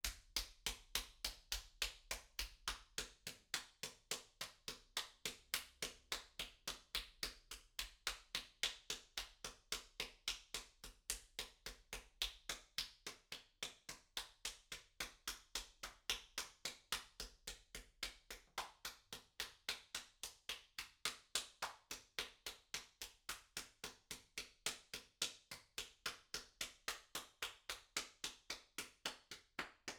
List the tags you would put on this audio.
Sound effects > Human sounds and actions
clapping
person
clap
solo
Applause
AV2
Tascam
FR-AV2
Rode
indoor
individual
Applauding
Solo-crowd
Applaud
NT5
XY

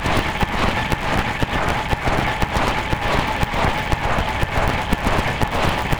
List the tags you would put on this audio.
Sound effects > Electronic / Design
commons
industrial
industrial-noise
industrial-techno
noise
rhythm
sci-fi
scifi
sound-design
techno

